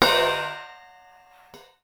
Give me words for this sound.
Music > Solo instrument
Cymbal Grab Stop Mute-005
Crash, Custom, Cymbal, Cymbals, Drum, Drums, FX, GONG, Hat, Kit, Metal, Oneshot, Paiste, Perc, Percussion, Ride, Sabian